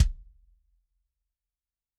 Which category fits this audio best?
Instrument samples > Percussion